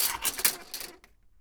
Sound effects > Other mechanisms, engines, machines
metal shop foley -097
wood, knock, percussion, rustle, pop, little, perc, oneshot, bam, bang, metal, boom, sfx, foley, thud, bop, tink, fx, strike, crackle, sound, tools